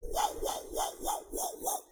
Sound effects > Objects / House appliances
Whirling Flying Disc Toy
I needed a flying disc toy SFX and quickly made. So it is not perfect.